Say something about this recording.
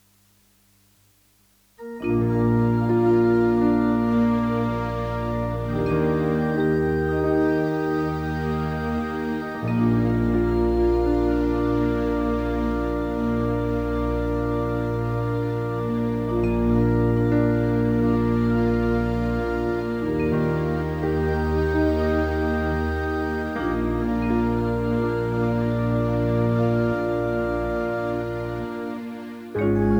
Music > Multiple instruments
Shadowst splash
Various keyboards layered to crate symphonic feeling. Blended through Audacity. Ideal for transition or under credits.